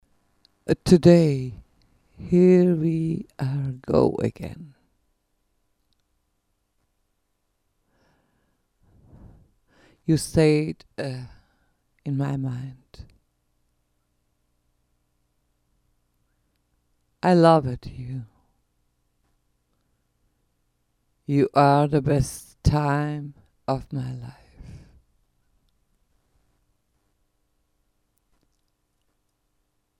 Sound effects > Human sounds and actions
For free. I think i could do this.